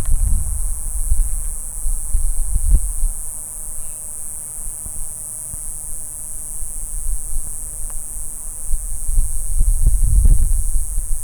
Sound effects > Animals
Cicada buzz recorded with ZoomH4essential portable recorder